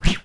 Sound effects > Natural elements and explosions
Subject : A whoosh from an electric mosquito racket. Date YMD : 2025 July 03 Location : Albi 81000 Tarn Occitanie France. Sennheiser MKE600 with stock windcover P48, no filter. Weather : Processing : Trimmed fades in / out in Audacity.